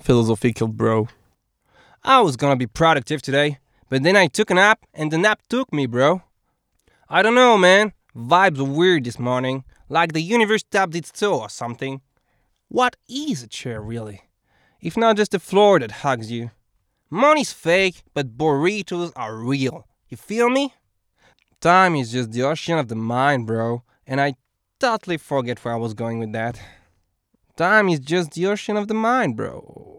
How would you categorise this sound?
Speech > Solo speech